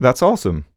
Speech > Solo speech
Joyful - Thats awesome
joy, singletake, happy, Neumann, dialogue, awesome, voice, joyful, Mid-20s, Video-game, Voice-acting, talk, Tascam, Man, Male, FR-AV2, oneshot, Single-take, U67, NPC, Human, Vocal